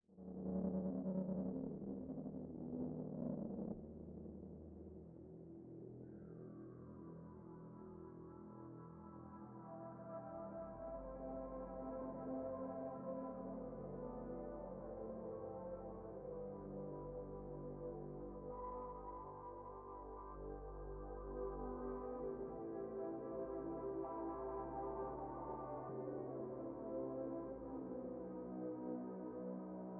Soundscapes > Synthetic / Artificial
Place Of Shine Dreams (Ambient)
Atmospheric and sublime ambient sound recorded with Fl Studio 10